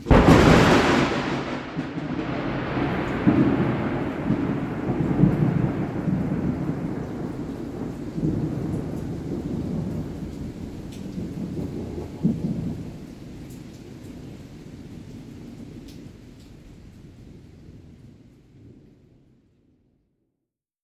Sound effects > Natural elements and explosions
THUN-Samsung Galaxy Smartphone, CU Thunder Crash, Loud, Distant Rain Nicholas Judy TDC
A loud thunder crash and distant rain.
Phone-recording, rain, loud, crash, lightning, thunder, rumble, distant